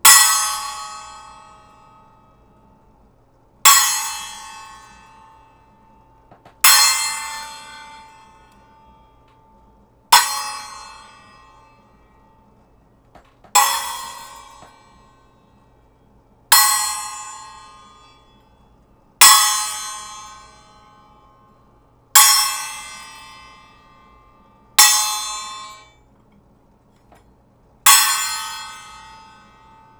Music > Solo percussion
MUSCPerc-Blue Snowball Microphone, CU 6 Inch Hand Cymbal, Hits Nicholas Judy TDC
6'' hand cymbal hits.
Blue-brand, Blue-Snowball, cymbal, hand, hits